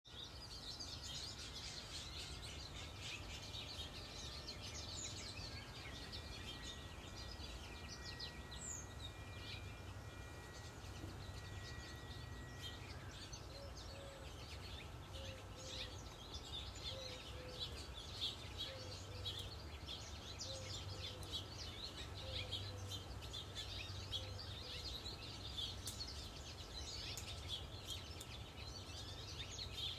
Soundscapes > Nature
Birds breeze bagpipe 08/14/2022
Birds breeze bagpipe
birds, breeze, farm